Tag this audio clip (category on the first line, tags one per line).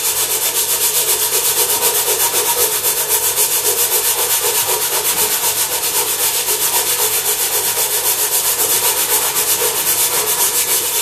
Sound effects > Objects / House appliances
clean
cleaner
noise
pot
rustle